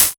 Instrument samples > Percussion
8 bit-Noise Open Hat 2
Hi ! Game Designers! I can't wait to see that how cool is it~! And, I synth it with phasephant!
FX, 8-bit, game, percussion